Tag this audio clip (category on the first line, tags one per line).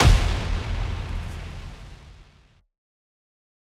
Sound effects > Electronic / Design
hit
sound-design